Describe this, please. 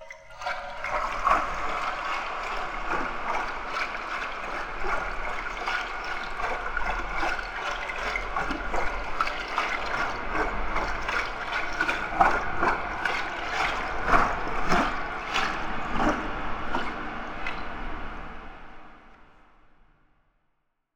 Sound effects > Experimental
Scary sloshing water ambience 2
A variety of water sounds processed with reverb and other effects, creating a sort of creepy, watery atmosphere.
atmospheric, waves, scary, sloshing, water, splashing, horror, splash, atmosphere